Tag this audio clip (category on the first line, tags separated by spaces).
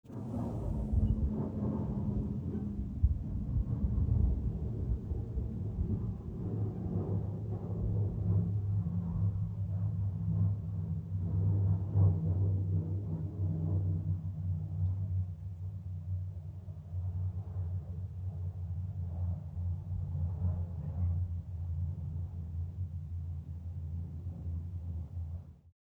Sound effects > Vehicles
aeroplane aircraft airplane aviation engine flight flying jet jumbo pilot plane